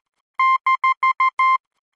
Sound effects > Electronic / Design
A series of beeps that denote the minus sign in Morse code. Created using computerized beeps, a short and long one, in Adobe Audition for the purposes of free use.